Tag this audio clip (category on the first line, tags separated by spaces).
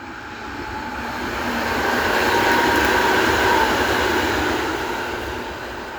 Soundscapes > Urban
Drive-by field-recording Tram